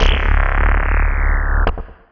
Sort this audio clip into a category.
Instrument samples > Synths / Electronic